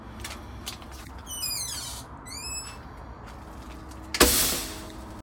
Sound effects > Objects / House appliances
Door Open and Close
A metal door opening and closing
opening, door, metal, close, open, closing, Metaldoor